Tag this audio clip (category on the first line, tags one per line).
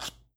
Sound effects > Objects / House appliances
foley
match
Phone-recording
light